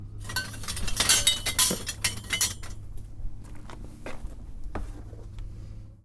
Sound effects > Objects / House appliances
Junkyard Foley and FX Percs (Metal, Clanks, Scrapes, Bangs, Scrap, and Machines) 128
Ambience, Bang, Junk, Metal, Metallic, Robot, waste